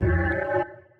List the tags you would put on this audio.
Sound effects > Electronic / Design
alert
confirmation
digital
interface
message

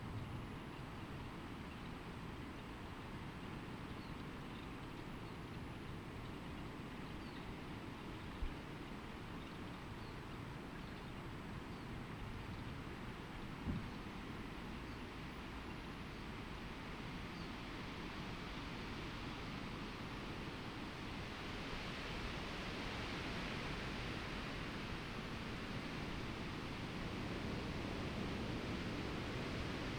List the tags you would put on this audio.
Soundscapes > Nature
data-to-sound
raspberry-pi
sound-installation
modified-soundscape
alice-holt-forest
artistic-intervention
field-recording
nature
natural-soundscape
weather-data
Dendrophone
phenological-recording
soundscape

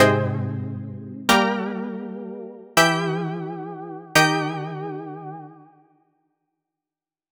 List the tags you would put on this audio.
Sound effects > Electronic / Design
Futuristic
Game
Sound-Effects
Video

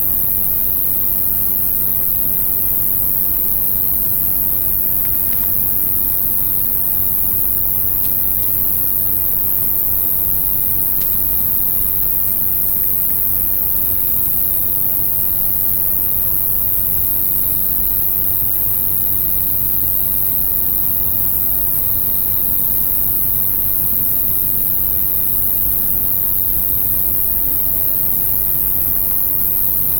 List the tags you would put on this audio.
Soundscapes > Nature
2025
81000
Albi
August
City
Early-morning
France
FR-AV2
insect
insects
Mono
night
NT5o
Occitanie
Omni
Rode
Single-mic-mono
Tarn
Tascam

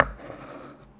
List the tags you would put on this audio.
Sound effects > Human sounds and actions
cut
desk
knife
move
wood

